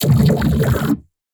Sound effects > Electronic / Design
Sample used from a drum loop in Flstudio original sample pack. Processed with KHS Filter Table, Vocodex, ZL EQ and Fruity Limiter.